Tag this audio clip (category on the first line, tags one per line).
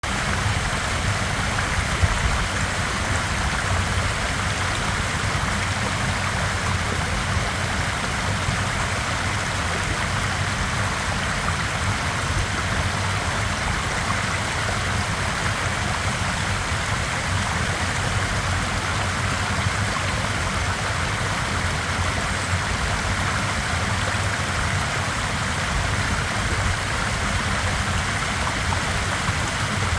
Soundscapes > Nature
BYSTRA
STREAM
WATER
MOUNTAIN
FIELD-RECORDING
NOISE
POTOK